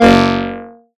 Instrument samples > Synths / Electronic
TAXXONLEAD 1 Bb
additive-synthesis, fm-synthesis, bass